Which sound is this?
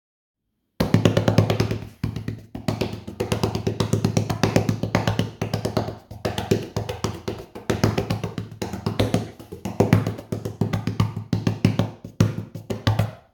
Sound effects > Human sounds and actions
TAP TAP TAP
tap tap on a wooden table